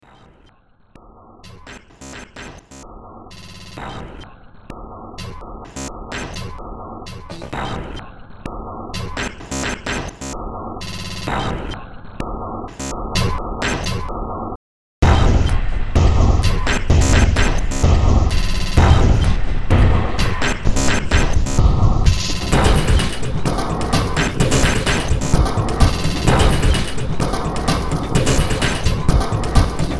Music > Multiple instruments
Demo Track #3284 (Industraumatic)
Noise, Underground, Cyberpunk, Ambient, Games, Soundtrack, Industrial, Horror, Sci-fi